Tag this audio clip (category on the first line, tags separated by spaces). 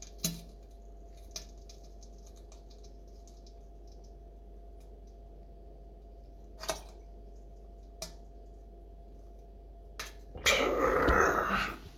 Sound effects > Other
poop shit toilet